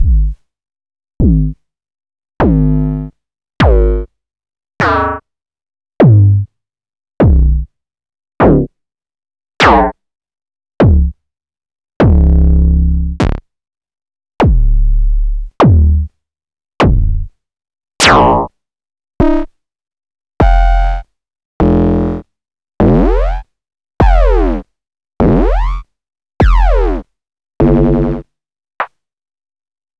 Instrument samples > Synths / Electronic
nnc perkons chain
48 Drum hits from Erica Synths Perkons HD-01
kit, drum